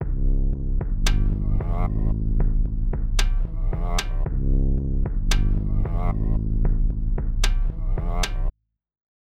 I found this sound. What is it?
Music > Multiple instruments
Caveman Trap
Specs Unknown. Made with Ableton Notes Made By Sticks
Bass, Beat, BPM, Cymbals, Drum, Drums, Free, Freemusic, Freesoundtracks, Groove, Hi, Hip, Kick, Loop, MIDI, Music, Rhythm, Sample, Snare, SoundTracks, Trap, Vocals, Weird